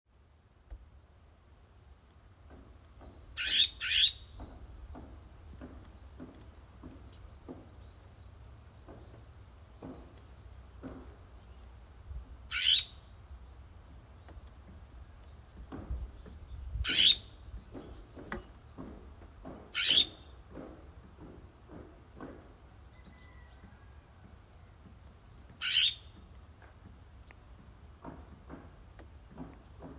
Soundscapes > Nature
Young blue Jay, hammering and car noise 08/18/2023
Blue Jay, hammering and car noise
animal, bird, blue-jay, farm